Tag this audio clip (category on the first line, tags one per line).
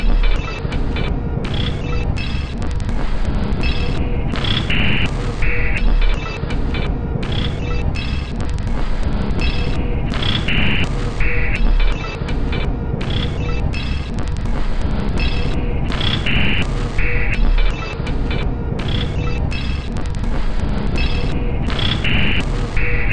Instrument samples > Percussion
Loop
Alien
Industrial
Drum
Dark
Ambient
Packs
Loopable
Soundtrack
Weird
Samples
Underground